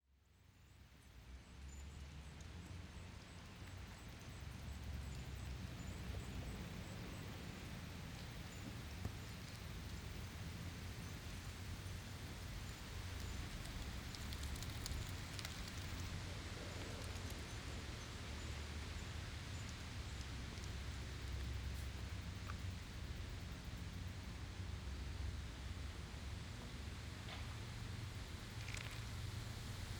Soundscapes > Nature
Leaves Skittering on asphalt street mixdown 9 5 2025
A very peaceful soundscape of a very very quiet small Illinois town during the middle of the day. Quiet except for the melancholy sound of dry, autumn leaves skipping and hopping down the middle of the street. I find soundscapes like this an instant way to reduce stress, and, an instant way to be transported back to our wonderful, innocent childhoods; childhoods filled with playing with the neighborhood kids until the street-light came on - or until your Mother called you home for supper, whichever came first! Enjoy Sound Devices 702 Microphones: a pair of Sennheiser MKH 416s arranged in XY configuration. Record Date: Friday September 5 2025.
Field-recording, Forest, Leaves, Peacefull, Wind, Woods